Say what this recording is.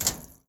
Sound effects > Objects / House appliances
DOORMetl-Samsung Galaxy Smartphone, CU Cage, Reptile, Close Nicholas Judy TDC
A reptile cage close.
cage, close, Phone-recording, reptile